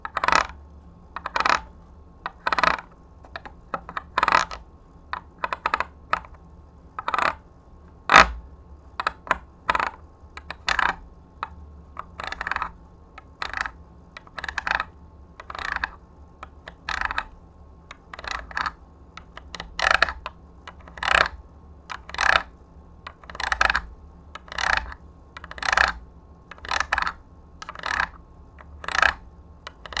Music > Solo percussion
MUSCPerc-Blue Snowball Microphone, CU Thai Wooden Frog, Grinds, Ribbits Nicholas Judy TDC
A thai wooden frog grinds and ribbits.
Blue-brand, Blue-Snowball, frog, grind, ribbit, thai, thailand, wooden